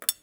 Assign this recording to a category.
Sound effects > Objects / House appliances